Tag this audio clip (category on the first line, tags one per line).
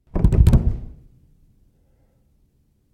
Sound effects > Human sounds and actions
floor
fall
body